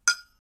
Sound effects > Other
1 - Menu Selection Foleyed with a H6 Zoom Recorder, edited in ProTools